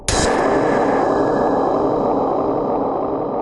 Sound effects > Electronic / Design
Impact Percs with Bass and fx-014
bash, bass, brooding, cinamatic, combination, crunch, deep, explode, explosion, foreboding, fx, hit, impact, looming, low, mulit, ominous, oneshot, perc, percussion, sfx, smash, theatrical